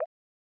Sound effects > Objects / House appliances
Drop PipetteDripFast 5 SFX
drop, pipette, water